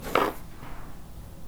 Sound effects > Other mechanisms, engines, machines
Handsaw Tooth Teeth Metal Foley 2
perc, metal, plank, smack, twang, saw, tool, hit, vibe, sfx, twangy, shop, handsaw, fx, foley, vibration, percussion, metallic, household